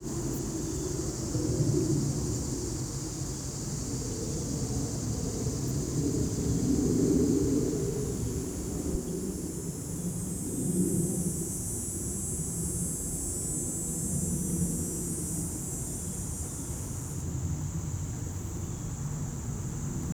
Soundscapes > Urban
Airplane and Machine-like Bug
Soundscape of my apartment near the airport--includes a plane and a machine-like whirring bug that I think might be a cicada, but not sure.